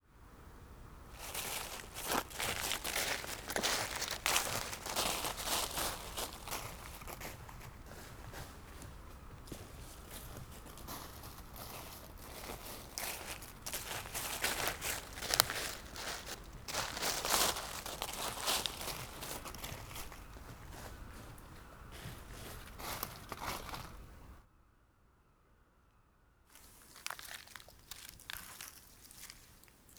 Sound effects > Human sounds and actions
walking very slow
Very slow walking in the forest
forest, slow, walk, walking